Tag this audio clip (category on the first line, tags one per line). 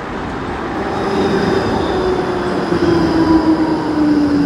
Sound effects > Vehicles
urban
Tram
city